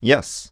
Speech > Solo speech
Joyful - Yes 4
joyful, Single-take, Video-game, dialogue, Man, approval